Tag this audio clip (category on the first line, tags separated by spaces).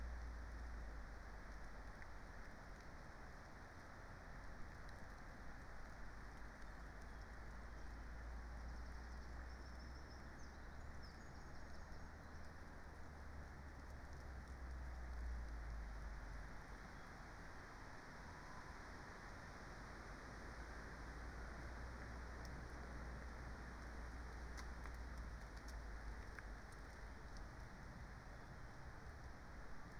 Soundscapes > Nature
phenological-recording raspberry-pi artistic-intervention soundscape field-recording weather-data Dendrophone sound-installation data-to-sound